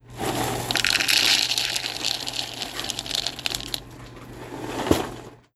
Sound effects > Objects / House appliances
FOODIngr-Samsung Galaxy Smartphone, CU Cereal, Pour Into Plastic Bowl Nicholas Judy TDC
Cereal being poured into plastic bowl.
bowl, cereal, foley, Phone-recording, plastic, pour